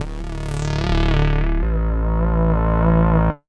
Sound effects > Experimental
Analog Bass, Sweeps, and FX-134
sci-fi, effect, trippy, korg, weird, sfx, vintage, robotic, complex, bass, retro, pad, oneshot, basses, sweep, sample, robot, electro, machine, snythesizer, scifi, analog, synth, mechanical, fx, dark, bassy, alien, analogue, electronic